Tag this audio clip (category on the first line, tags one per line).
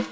Music > Solo percussion
beat
brass
crack
drums
ludwig
oneshot
perc
percussion
realdrums
reverb
rimshot
rimshots
roll
sfx
snaredrum
snareroll